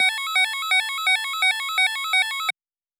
Sound effects > Electronic / Design
Old-school sci-fi style synthesised sci-fi radar scanner.